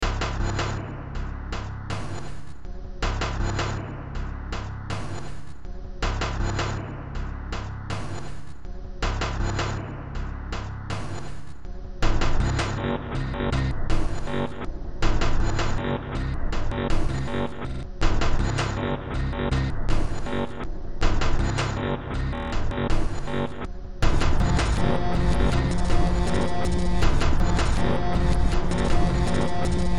Music > Multiple instruments
Demo Track #3349 (Industraumatic)
Underground, Horror, Games, Industrial, Cyberpunk, Soundtrack, Sci-fi, Ambient, Noise